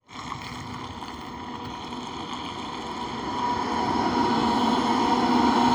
Sound effects > Vehicles
Sounds of a tram in wet, cool, and windy weather. Recorded using a mobile phone microphone, Motorola Moto G73. Recording location: Hervanta, Finland. Recorded for a project assignment in a sound processing course.
drive, vehicle